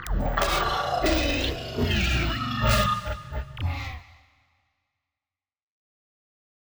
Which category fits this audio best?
Sound effects > Experimental